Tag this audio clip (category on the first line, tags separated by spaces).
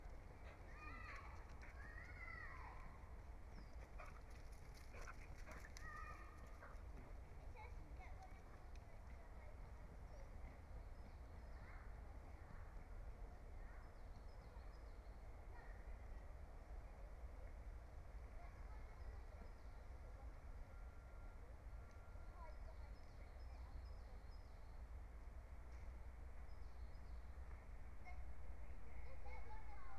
Soundscapes > Nature
field-recording soundscape raspberry-pi meadow nature alice-holt-forest phenological-recording natural-soundscape